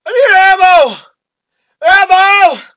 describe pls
Speech > Solo speech
Soldier screaming for ammo